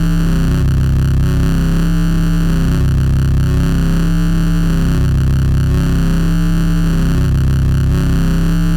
Sound effects > Other mechanisms, engines, machines
IDM Atmosphare6( C note )
Hi ! That's not recording sound :) I synth it with phasephant!
IDM, Industry, Machinery, Noise